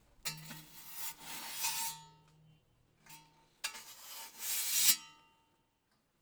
Sound effects > Objects / House appliances
Shovel cling
riding the shovel Recorded with zoom H2n, edited with RX
metal, metallic, shovel